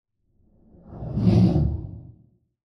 Sound effects > Electronic / Design
JET WHOOSH LOW
From a collection of whooshes made from either my Metal Marshmallow Pro Contact Mic, Yamaha Dx7, Arturia V Collection
jet, synth, gaussian, ui, whoosh, whip, pass-by, transition, swoosh, air, flyby, Sound